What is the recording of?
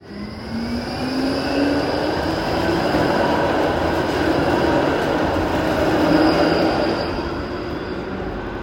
Soundscapes > Urban
Tram passing Recording 36
Rail, Train, Tram